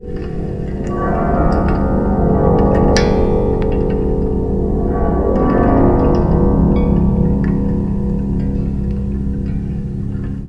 Soundscapes > Nature
Text-AeoBert-Pad-pings-12
Here are a few edits from a long recording (12 hour) of storm Bert November 2024 here in central Scotland. The sounds are both the wind swelling on the harp in addition to the rain hitting the strings of my DIY electric aeolian harp. THis is a selection of short edits that reflect the more interesting audio moments captured.
pings, rain, moody, aeolian, storm, swells